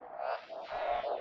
Soundscapes > Synthetic / Artificial
LFO Birdsong 30
massive, lfo, bird